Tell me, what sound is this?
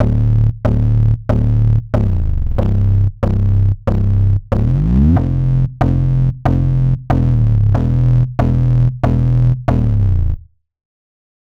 Music > Solo instrument
93bpm - PsyTechBass13 Dminor - Master
3 of 3 Variant 1 of PsyTechBass.